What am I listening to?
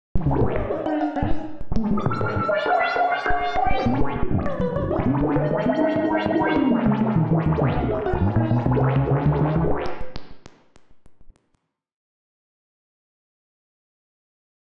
Sound effects > Electronic / Design
Optical Theremin 6 Osc Shaper Infiltrated-009
Alien, Analog, Chaotic, Crazy, DIY, EDM, Electro, Electronic, Experimental, FX, Gliltch, IDM, Impulse, Loopable, Machine, Mechanical, Noise, Oscillator, Otherworldly, Pulse, Robot, Robotic, Saw, SFX, strange, Synth, Theremin, Tone, Weird